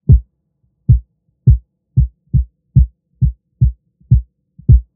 Sound effects > Experimental
Plastic Comb Kicks EQ
Recording of plastic comb processed to sound like kick drum
recorded, sample, techno